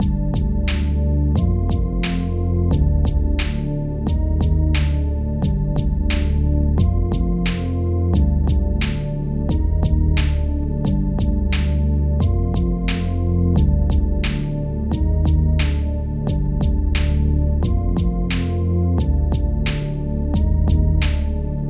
Music > Multiple instruments
D# minor | 177bpm | 4/4 Mysterious castle corridor at night. The track is intended as a short teaser loop for use in games and creative projects. I’ll be happy to adjust them for you whenever I have time!